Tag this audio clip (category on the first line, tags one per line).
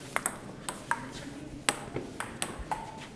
Soundscapes > Indoors
pong
ping
biennale